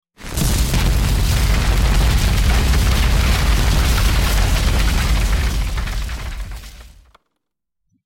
Sound effects > Other
war zone after strike cracklings